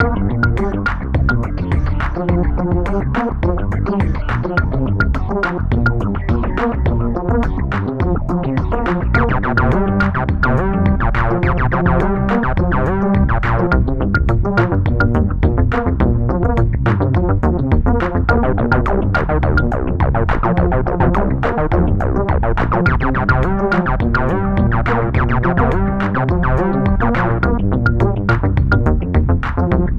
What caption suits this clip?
Music > Other
A cheesy, lofi music loop similar to the ones in SNES Shadowrun's club scenes.
cheesy, club, cyberpunk, lofi, loop, shadowrun